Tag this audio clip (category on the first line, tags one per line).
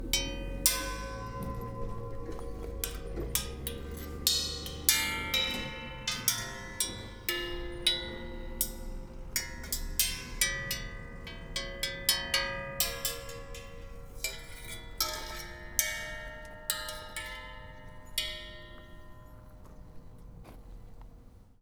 Sound effects > Objects / House appliances
Ambience
Atmosphere
Bang
Bash
Clang
Clank
Dump
dumping
dumpster
Environment
Foley
FX
garbage
Junk
Junkyard
Machine
Metal
Metallic
Perc
Percussion
rattle
Robot
Robotic
rubbish
scrape
SFX
Smash
trash
tube
waste